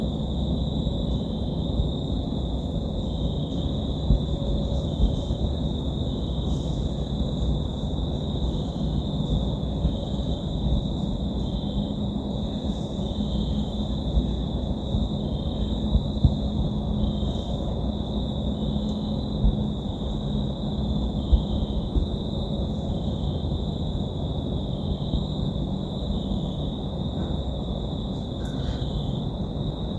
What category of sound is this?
Soundscapes > Nature